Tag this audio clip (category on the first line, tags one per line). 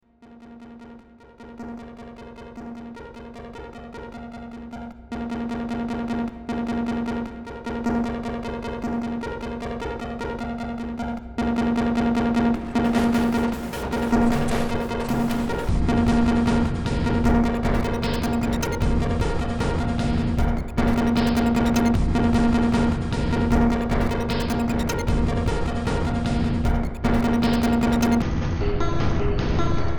Music > Multiple instruments
Cyberpunk
Games